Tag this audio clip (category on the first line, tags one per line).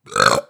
Sound effects > Human sounds and actions
Body
Human
Sound
Strange